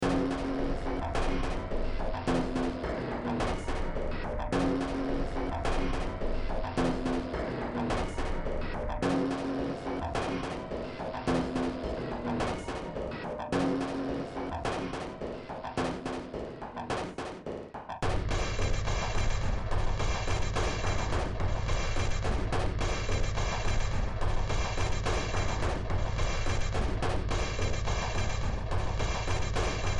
Music > Multiple instruments
Demo Track #3582 (Industraumatic)
Cyberpunk, Noise, Sci-fi, Soundtrack, Underground